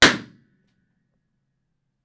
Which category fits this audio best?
Soundscapes > Indoors